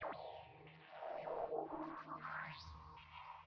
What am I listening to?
Soundscapes > Synthetic / Artificial
LFO Birdsong 15
birds, massive